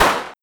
Sound effects > Other mechanisms, engines, machines
Just a simple gunshot sound.